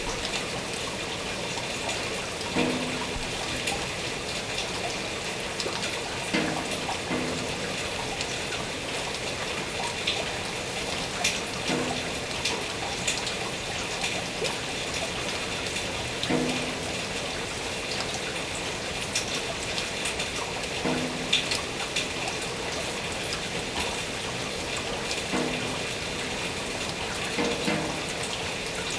Soundscapes > Nature
Rain drips onto a boiler stereo
Listen to raindrops hitting a boiler from time to time.